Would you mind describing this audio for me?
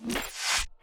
Sound effects > Experimental
Glitch Percs 16 whoomsh
abstract, percussion, otherworldy, experimental